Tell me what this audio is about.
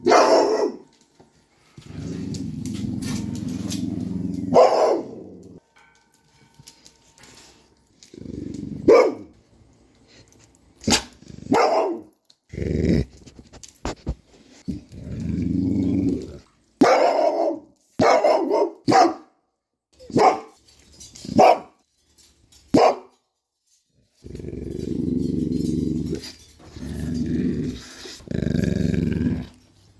Sound effects > Animals
ANMLDog-Samsung Galaxy Smartphone, CU Aggresive Dog Barks and Snarls, Distant Wind Chimes Nicholas Judy TDC
Aggressive dog barking and snarling with some wind chimes in distance. Performed by Brionna's dog, Avery.
aggresive, barking, distance, dog, Phone-recording, snarling, wind-chimes